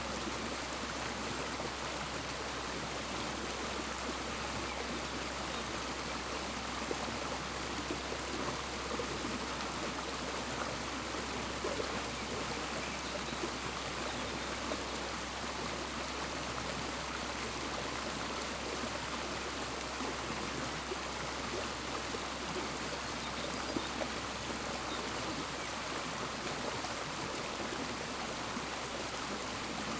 Soundscapes > Nature
20250519 12h46 Albi - Lechappee vert - Concrete cubes (near cemetery level)
Subject : Date : 2025 05/May 19 around 13h Location : Albi 81000 Tarn Occitanie France Weather : Hardware : Zoom H2n on a "gooseneck/clamp" combo for action cams. Processing : Trim and normalised.